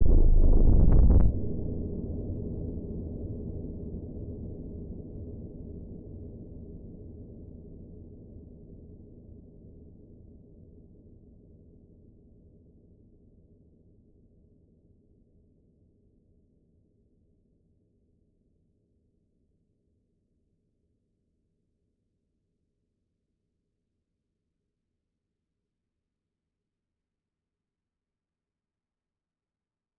Sound effects > Other
Soul Parasite
And you just wait for phase three. Made with FL Studio.